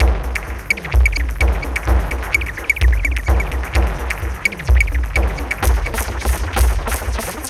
Music > Solo percussion
128 CR5000 Loop 01
128bpm, 80s, Analog, AnalogDrum, Beat, CompuRhythm, CR5000, Drum, DrumMachine, Drums, Electronic, Loop, Roland, Synth, Vintage